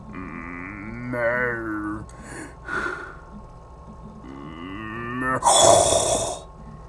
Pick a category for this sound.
Sound effects > Human sounds and actions